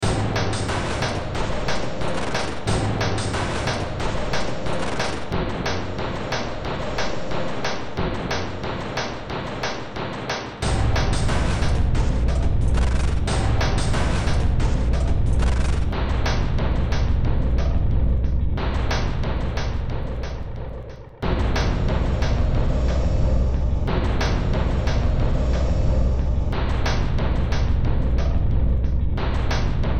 Music > Multiple instruments
Short Track #3262 (Industraumatic)
Cyberpunk, Sci-fi, Industrial, Games, Underground, Soundtrack, Horror, Ambient, Noise